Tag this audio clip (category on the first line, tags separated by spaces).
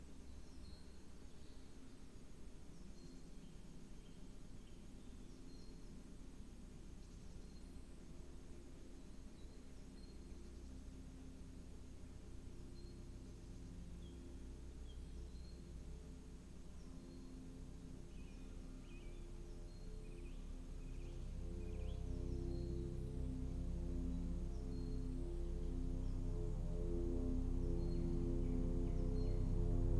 Soundscapes > Nature
natural-soundscape soundscape nature sound-installation phenological-recording data-to-sound modified-soundscape Dendrophone alice-holt-forest artistic-intervention weather-data field-recording raspberry-pi